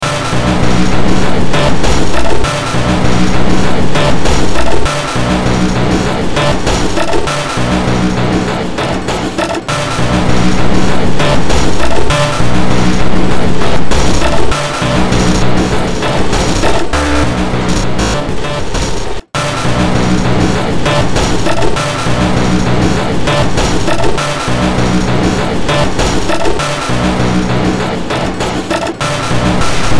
Music > Multiple instruments
Short Track #3522 (Industraumatic)
Ambient, Cyberpunk, Games, Horror, Industrial, Noise, Sci-fi, Soundtrack, Underground